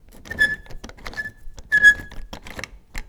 Sound effects > Objects / House appliances
The sound of a squeaky door lock closing